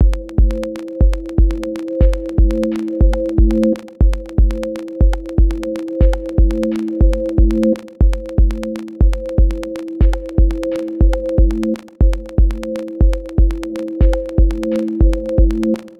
Music > Solo percussion
Organic Drum Loop 120bpm #003
This is a drum loop created with my beloved Digitakt 2. I like its organic simple sound.